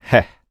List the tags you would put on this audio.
Speech > Solo speech
NPC
Mid-20s
Male
Single-take
FR-AV2
Video-game
Man
Neumann
Tascam
oneshot
singletake
sound
cocky
smug
dialogue
voice
sarcastic
U67
Human
Vocal
talk
Voice-acting

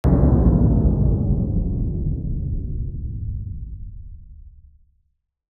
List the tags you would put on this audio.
Sound effects > Electronic / Design
shut-down
engine-deactivate
deactivate
computer-power-down
turn-off
machine-power-down
powering-down
power-off

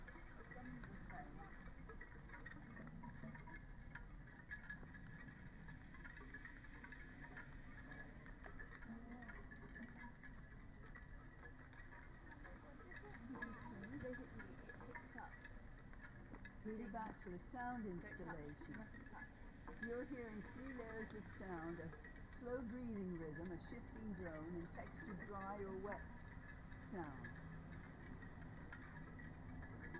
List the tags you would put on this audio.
Soundscapes > Nature
sound-installation
alice-holt-forest
phenological-recording
natural-soundscape
artistic-intervention
raspberry-pi
nature
Dendrophone
soundscape
field-recording
modified-soundscape
data-to-sound
weather-data

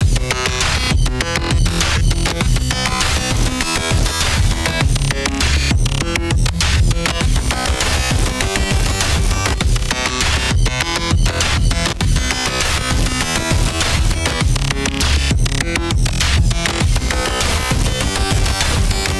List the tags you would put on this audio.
Music > Multiple instruments
rythms
noise
cyber
drums
glitchy
weird
robotic
cyberpunk
glitch

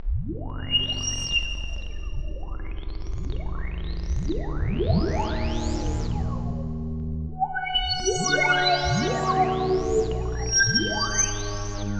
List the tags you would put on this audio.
Soundscapes > Synthetic / Artificial
vst
science-fiction
dark-design
drowning
noise
dark-techno
scifi
dark-soundscapes
content-creator
mystery
cinematic
noise-ambient
sound-design
horror
sci-fi
PPG-Wave

